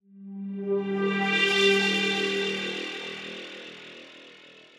Sound effects > Electronic / Design
One-shot FX designed for quick transitions and drops. Sharp, clean, and impactful — perfect for adding tension, accentuating changes, or layering in bass music and psytrance productions. 150 BPM – G minor – heavily processed, so results may vary!